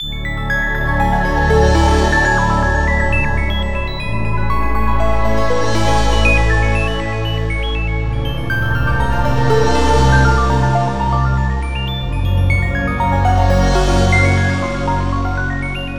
Music > Multiple instruments
Elysian Depths 2 (Mix #2, no piano)
ocean-music, water-level-music, ocean-soundtrack, 120-bpm, ocean-theme, ocean-documentary-theme, water-level-theme, Lux-Aeterna-Audio, glorious-sea, gleaming-ocean, vast-ocean, glittering-ocean, ocean-loop, ocean-documentary-music, swimming-theme, Dylan-Kelk, water-theme, glorious-ocean, water-level, soothing-loop